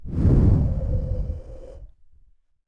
Sound effects > Other
spell wind c
10 - Strong WInd Spells Foleyed with a H6 Zoom Recorder, edited in ProTools